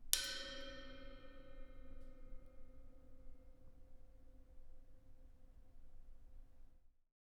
Sound effects > Objects / House appliances
Hitting metal staircase 4
Echo, Staircase